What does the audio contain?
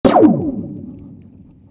Sound effects > Experimental
Laser Gun 02
Laser gun shooting
weapon, sci-fi, shoot, gun, laser